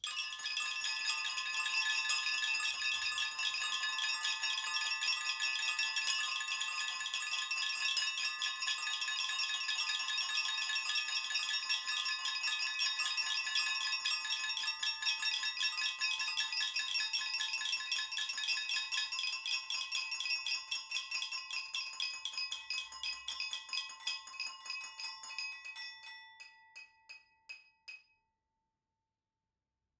Sound effects > Human sounds and actions
Glass applause Bus 2 (light)
A series of me recording multiple takes in a medium sized bedroom to fake a crowd. Clapping/talking and more original applause types, at different positions in the room. Recorded with a Rode NT5 XY pair (next to the wall) and a Tascam FR-AV2. You will find most of the takes in the pack.
FR-AV2, Tascam, glass, NT5, Rode, XY, applause, wine-glass, processed, solo-crowd, cheer, freesound20, stemware, indoor, mixed, crowd, wineglass